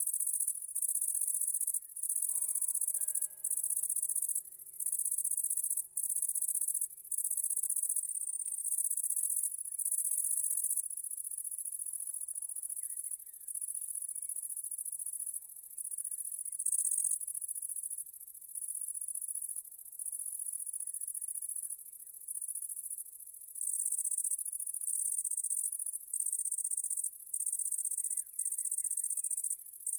Soundscapes > Nature
orthoptères CloseUp
(Loud) close up of a bunch of orthoptera - crickets, cicadas, grillons... - singing in a hedge, 8PM, june 2025, Bourgogne. A few birds in the background.